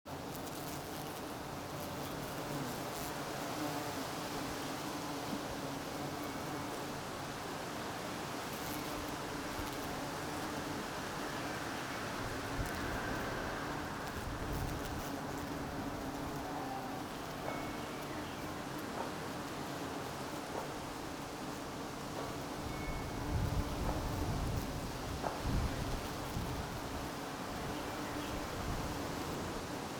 Soundscapes > Urban
Bumblebees and honeybees buzzing around a wisteria bush in an urban garden in Spring. I haven't put it in that pack because of the change of microphone position and recording level. The recorder is placed right up among the blossoms, but you can still hear various sounds of the surrounding environment: passing cars, sirens, wind chimes, wind, human activity, and birds (Northern Cardinal and Carolina Wren). Recorded on 2025-05-07 at about 16:30. Recorder: Sony PCM-D50, mics in 120-degree (wide) position, using a windscreen. Processing: 2-octave high-pass filter at 100Hz.